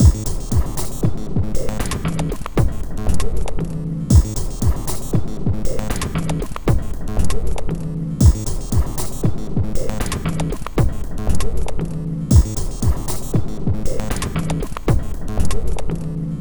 Instrument samples > Percussion
This 117bpm Drum Loop is good for composing Industrial/Electronic/Ambient songs or using as soundtrack to a sci-fi/suspense/horror indie game or short film.
Underground, Loopable, Packs, Drum, Loop, Ambient, Weird, Soundtrack, Alien, Dark, Industrial, Samples